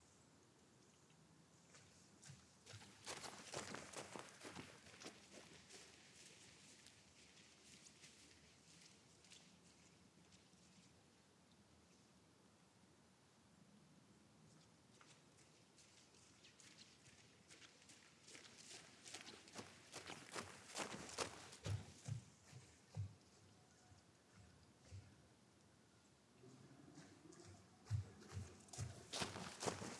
Sound effects > Human sounds and actions
walking fast mono
Fast walking in the forest in mono
walk, fast, forest